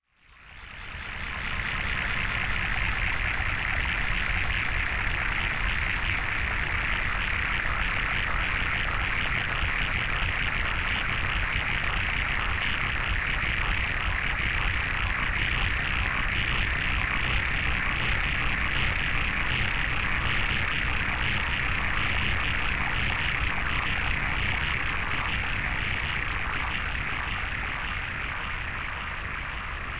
Soundscapes > Other
RGS-Random Glitch Sound 7-Glitch Water Fall-1
Synthed with 3xOsc only. A beat loop from Bandlab as the carrier of the vocodex. Processed with OTT, ZL EQ, Fracture
Water, Ambient, Sound-design, Waterfall, Glitch, Synthtic